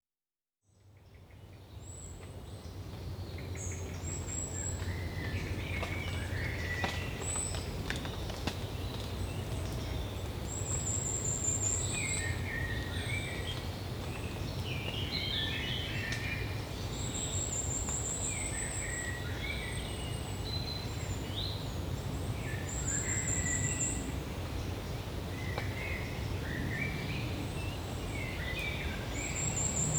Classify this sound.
Soundscapes > Nature